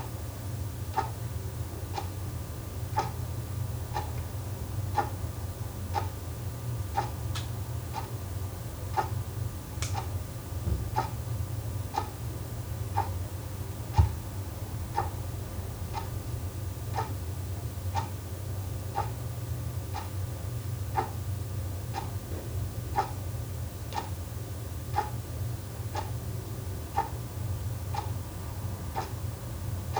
Sound effects > Electronic / Design
The sound of a clock ticking in my living room recorded on Sound devices and Neumann kmr82i
clock, loop